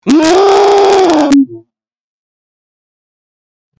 Sound effects > Other
The old screech that made in early 2023 or 2024.